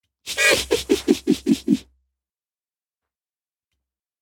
Speech > Other
clown creepy2
Laugh of creepy clown Record with my own voice, edit by Voicemod
clown, horror, laugh